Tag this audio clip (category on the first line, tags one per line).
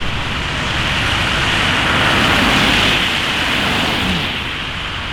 Sound effects > Vehicles
field-recording
car
drive
automobile
rainy
vehicle